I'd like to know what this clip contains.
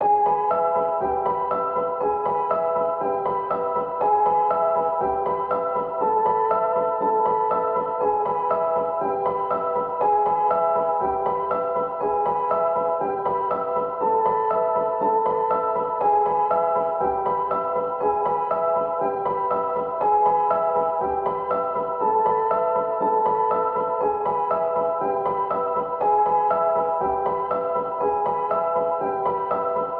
Music > Solo instrument
Piano loops 084 efect 4 octave long loop 120 bpm
120, free, music, pianomusic, simple